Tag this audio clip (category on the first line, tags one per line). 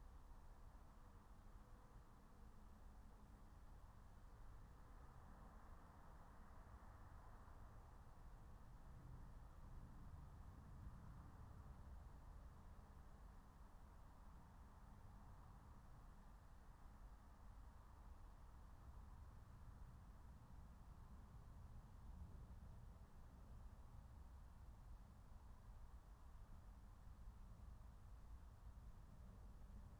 Soundscapes > Nature
alice-holt-forest
natural-soundscape
phenological-recording
field-recording
nature
soundscape
meadow
raspberry-pi